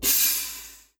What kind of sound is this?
Sound effects > Other mechanisms, engines, machines
AIRHiss-Samsung Galaxy Smartphone, CU Primo FlavorStation, Loud Air Release Nicholas Judy TDC
A loud air release from a primo flavorstation.
air, flavorstation, loud, Phone-recording, primo, release